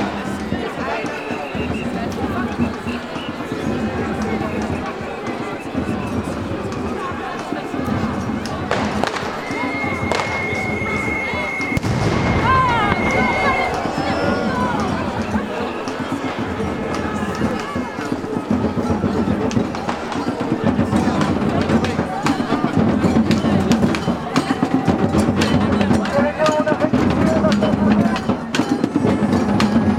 Soundscapes > Urban
Manifestation in Brussels / Siamo tutti antifascisti
13 th of February 2025, Brussels : national manifestation / demonstration for public services and purchasing power 13 février 2025, Bruxelles : manifestation / grève nationale pour les services publics et le pouvoir d'achat Recorded with Microphone = Sanken CMS-50 (MS) decoded in STEREO Recorder = Sound Devices MixPre 3 I REF = 25_02_13_13_04
streets, voices, demonstration